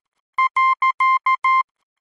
Sound effects > Electronic / Design
Morse Punto
A series of beeps that denote the period in Morse code. Created using computerized beeps, a short and long one, in Adobe Audition for the purposes of free use.
Morse, Telegragh, Language